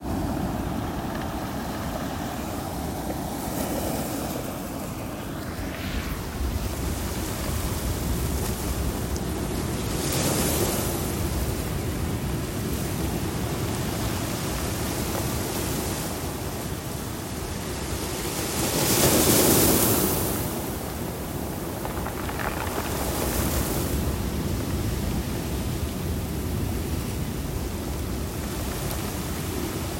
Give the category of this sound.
Soundscapes > Nature